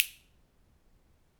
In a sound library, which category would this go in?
Sound effects > Other